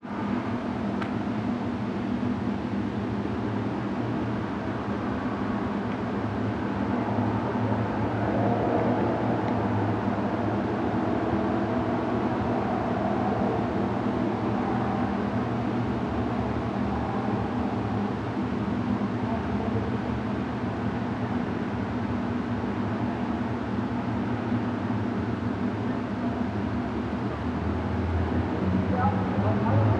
Soundscapes > Urban
Sound collage of a man yelling in a parking lot, provoked by our magickal order's cursed Talisman. Metadata collections and dithering options explored in Audacity. Recorded around 8pm in an Urban landscape. ALL sounds original source. and glory to all the world. glory to the wretch and the begger. glory to the pull; and the lever. Combinations of recordings from hidden video audio. In this way, a short span of time folds in over itself.